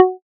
Instrument samples > Synths / Electronic
APLUCK 4 Gb
fm-synthesis, additive-synthesis, pluck